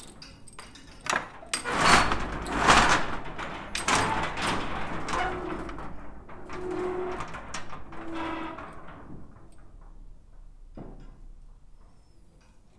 Sound effects > Objects / House appliances
bay door jostle metal-004
bonk, clunk, drill, fieldrecording, foley, foundobject, fx, glass, hit, industrial, mechanical, metal, natural, object, oneshot, perc, percussion, sfx, stab